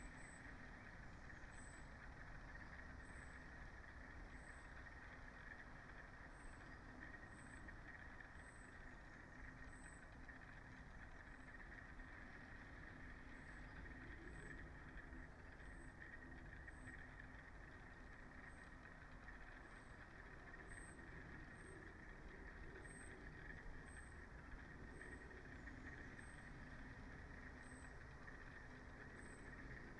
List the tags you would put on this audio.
Soundscapes > Nature
artistic-intervention soundscape weather-data natural-soundscape data-to-sound sound-installation alice-holt-forest modified-soundscape phenological-recording Dendrophone raspberry-pi nature field-recording

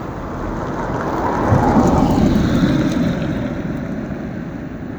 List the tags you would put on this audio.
Sound effects > Vehicles
automobile car vehicle

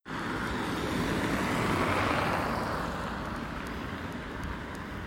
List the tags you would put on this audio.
Soundscapes > Urban
car vehicle tampere

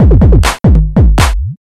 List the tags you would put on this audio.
Music > Multiple instruments
eletro batidas rap eletronic beat percussion-loop music

